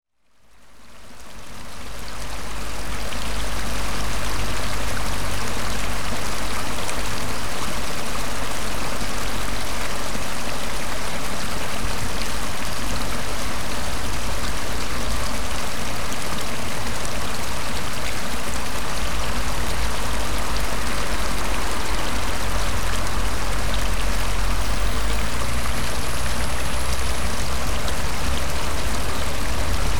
Soundscapes > Other
A recording of water passing through a culvert and over a small weir. Traffic in the background.
field-reording, flow, stream, water, weir